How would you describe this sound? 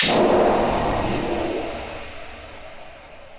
Sound effects > Electronic / Design
Impact Percs with Bass and fx-019
crunch, hit, smash, sfx, combination, bash, ominous, deep, low, theatrical, percussion, looming, bass, oneshot, foreboding, fx, impact, perc, brooding, explode, cinamatic, mulit, explosion